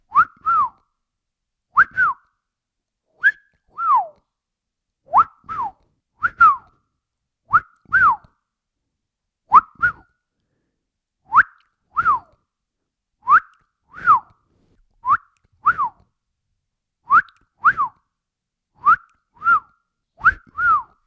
Sound effects > Human sounds and actions
Catcalling whistle - SM57
Don't do this to people. Recorded with a SM57 and A2WS windcover, using a Tascam FR-AV2. Recorded indoors, in my bedroom which has way more reverb than id like... Date : 2025 05 26 I'm a mid 20s male if that's of any relevance.
male, Shure, cat-call, Sm57, whistling, cat-calling, Indoor, 20s, FR-AV2, A2WS-Windcover, whistle, 2025, Tascam